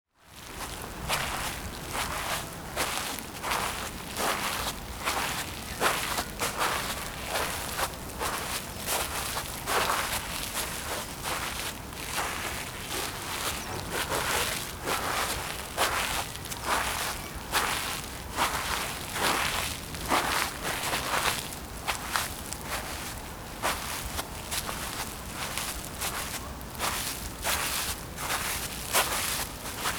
Sound effects > Human sounds and actions
Walking barefoot on thick artificial grass in a small suburban backyard. Some faint suburban ambience (birds etc) can be heard in the background.